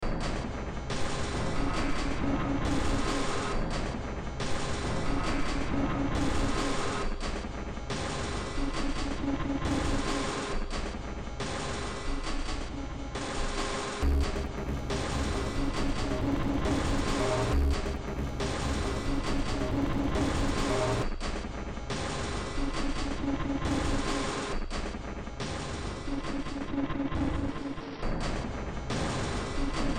Music > Multiple instruments
Short Track #3869 (Industraumatic)
Games, Soundtrack, Underground, Sci-fi, Cyberpunk, Noise, Horror, Ambient, Industrial